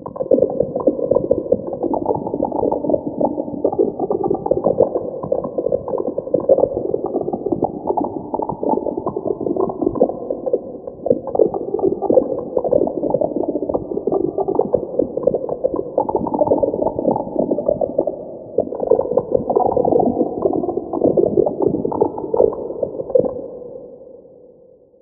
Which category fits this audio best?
Soundscapes > Nature